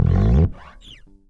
Music > Solo instrument
slide up
bass, basslines, blues, chords, electric, funk, fuzz, low, lowend, note, notes, pick, pluck, riff, riffs, rock, slides